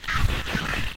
Sound effects > Other
combo ice dark
37 - Combined Ice and Dark Spells Sounds foleyed with a H6 Zoom Recorder, edited in ProTools together